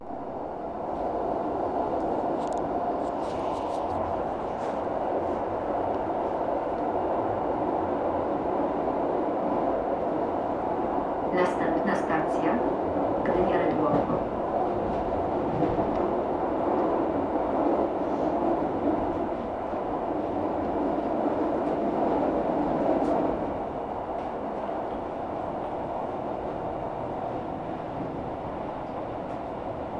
Soundscapes > Urban
Tricity Train Ride
Old recording, made probably with phone, during one of my 2015's ride by Tricity Fast Train. This particular recording has a very loud and clear "next stop" announcements sounds. There are also two cuts here, can you spot those? Translated announcements: #0:12 Next station: Gdynia, Redłowo #1:07 Station: Gdynia, Redłowo #1:43 "Individual door opening has been activated, please use buttons to open."
ride, announcement, train, tricity